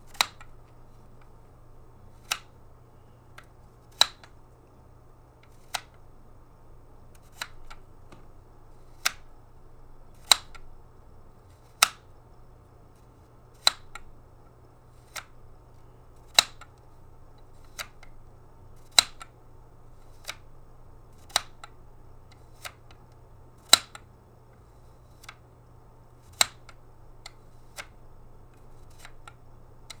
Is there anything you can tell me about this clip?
Sound effects > Objects / House appliances
A vacuum cleaner clicking and sliding from low carpet to plush carpet.